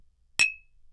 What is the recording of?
Sound effects > Objects / House appliances
Bottle Clink 5
sound of two glass bottle being tapped together, recorded with sure sm57 into adobe audition for a university project